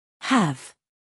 Speech > Solo speech
to have
english, pronunciation, voice, word